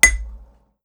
Music > Solo percussion
MUSCTnprc-Blue Snowball Microphone, CU Agogo Bells, Muted, Single High Note Nicholas Judy TDC
A single, high muted agogo bell note.
muted, high, Blue-brand, sample, agogo, agogo-bell, note, single, bell, Blue-Snowball